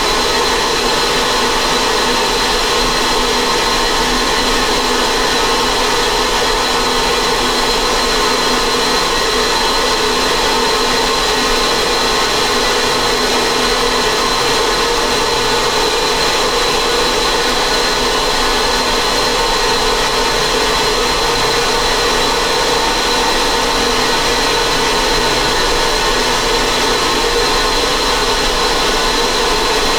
Sound effects > Experimental
"The shiver up her spine was so profound she fainted. Only to reawaken 36 hours later in the hospital." For this upload I recorded ambient noises in my home with a Zoom H4N multitrack recorder. Those raw sounds were mutated, stretched and worked with (using Audacity)until a final piece was ready. Which is this audio file.
audacity, clanging, fear, horns, horror, panic, scratching, screeching, sirens, tense, thriller, zoom-h4n